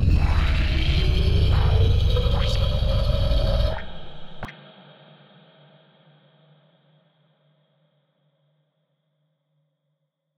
Sound effects > Experimental
Creature Monster Alien Vocal FX-15
Monster, visceral, gamedesign, Vox, demon, Reverberating, fx, Echo, Deep, Monstrous, Snarl, Frightening, gutteral, Creature, Sound, boss, Snarling, Vocal, Alien, Sounddesign, Ominous, Growl, devil, evil, sfx, Otherworldly, Groan, scary